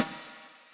Music > Solo percussion
Snare Processed - Oneshot 220 - 14 by 6.5 inch Brass Ludwig
acoustic, beat, brass, crack, drum, drumkit, drums, flam, fx, hit, hits, kit, ludwig, oneshot, perc, percussion, processed, realdrum, realdrums, reverb, rim, rimshot, rimshots, roll, sfx, snare, snaredrum, snareroll, snares